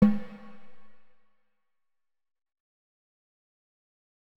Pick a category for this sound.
Music > Solo percussion